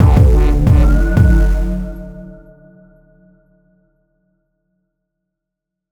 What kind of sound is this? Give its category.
Sound effects > Electronic / Design